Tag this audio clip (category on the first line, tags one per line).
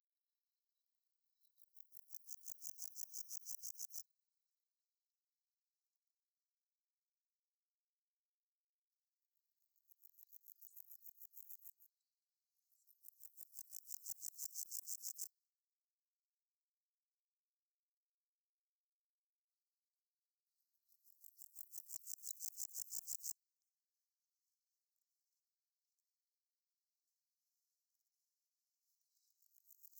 Sound effects > Animals
Insects
SFX